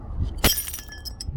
Sound effects > Human sounds and actions
Glass Bottle Shatter
a glass bottle being thrown against the ground and shattering recorded on my phone microphone the OnePlus 12R
break, shatter, glass